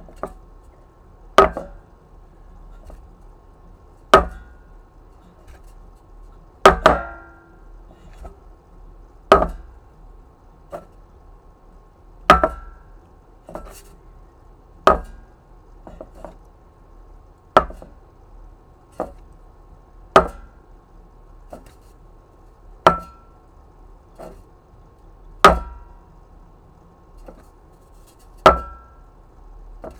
Sound effects > Objects / House appliances
A metal bucket picking up and putting down.